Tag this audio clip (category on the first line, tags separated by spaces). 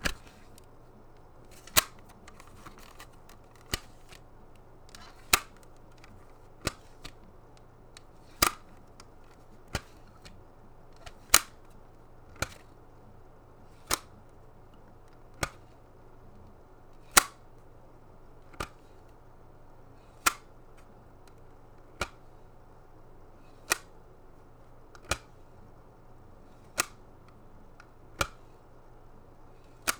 Sound effects > Objects / House appliances
case close